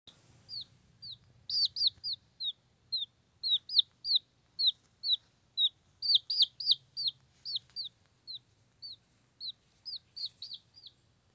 Sound effects > Animals
Recorded with an LG Stylus 2022.